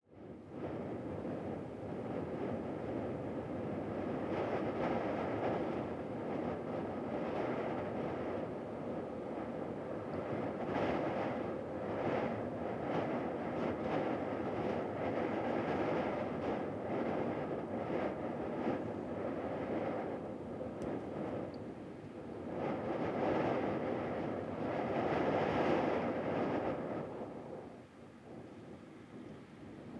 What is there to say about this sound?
Sound effects > Objects / House appliances
gusts on roof window
Gusts of wind on roof window, close up.